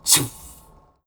Sound effects > Human sounds and actions
MAGPoof-Blue Snowball Microphone, CU Vocal, Cartoon Nicholas Judy TDC
A vocal 'poof'. Cartoon.
Blue-Snowball, vocal